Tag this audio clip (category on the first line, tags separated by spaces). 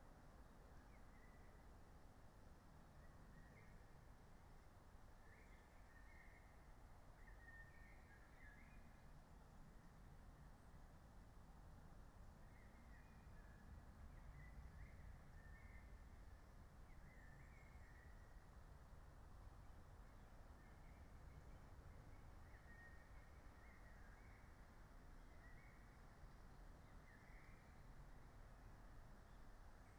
Soundscapes > Nature
alice-holt-forest artistic-intervention data-to-sound Dendrophone field-recording modified-soundscape natural-soundscape phenological-recording raspberry-pi sound-installation soundscape weather-data